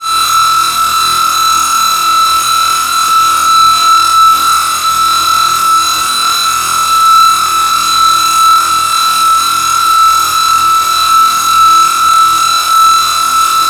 Sound effects > Electronic / Design
I synth it with phasephant and 3xOSC! I was try to synth a zaag kick but failed, then I have a idea that put it into Granular to see what will happen, the result is that I get this sound.